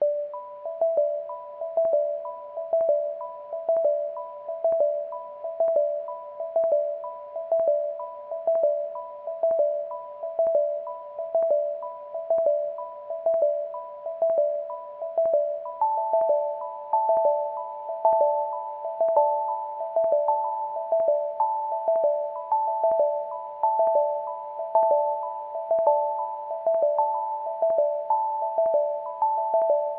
Music > Multiple instruments
Drift - Minimal
Made using Droplets in ProTools. Percussion (claps, stick clicks) and synth bass are through Xpand 2. Cello and piano are from Labs. No samples or premade loops used used. BPM 95.